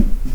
Sound effects > Other mechanisms, engines, machines
shop foley-005
bam, bang, boom, bop, crackle, foley, fx, knock, little, metal, oneshot, perc, percussion, pop, rustle, sfx, shop, sound, strike, thud, tink, tools, wood